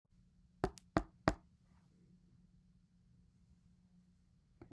Soundscapes > Indoors
knocking on wood
foley, noise, wood